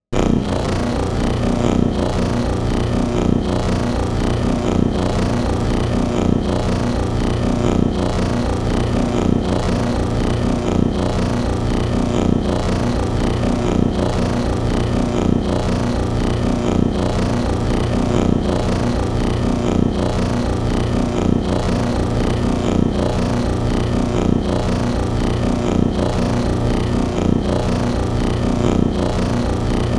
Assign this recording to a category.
Sound effects > Experimental